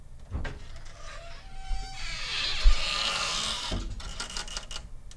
Sound effects > Objects / House appliances
Squeaky wood door opening